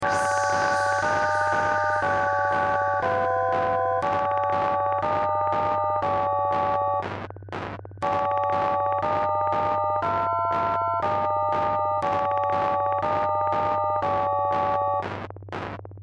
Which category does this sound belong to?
Music > Multiple instruments